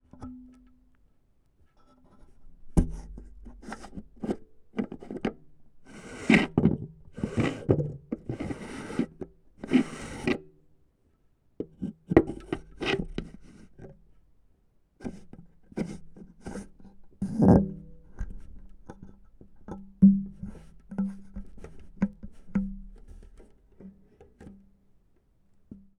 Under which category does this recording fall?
Instrument samples > Percussion